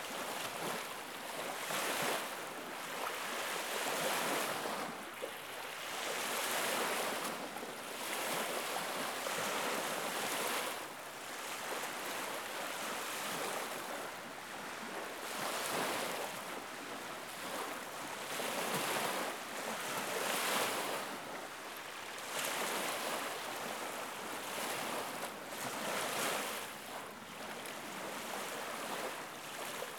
Soundscapes > Nature
LakeShore LakeChamplain-VT
Field recording of waves crashing on the shore of Lake Champlain, Vermont. At one point a car passes in the background.
beach, field-recording, lake, lapping, shore, waves